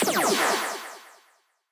Sound effects > Electronic / Design
Made using serum